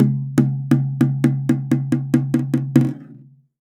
Music > Solo instrument
Toms Misc Perc Hits and Rhythms-006
Crash, Custom, Cymbal, Cymbals, Drum, Drums, FX, GONG, Hat, Kit, Metal, Oneshot, Paiste, Perc, Percussion, Ride, Sabian